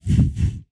Sound effects > Other
spell fire c
13 - Strong Fire Spells Foleyed with a H6 Zoom Recorder, edited in ProTools
fire, heavy, spell